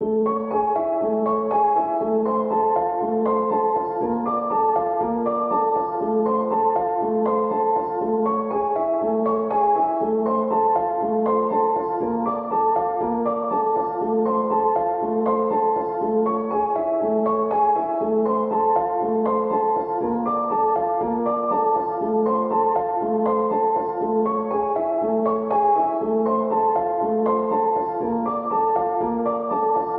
Music > Solo instrument
Piano loops 142 efect 4 octave long loop 120 bpm
120, 120bpm, free, loop, music, piano, pianomusic, reverb, samples, simple, simplesamples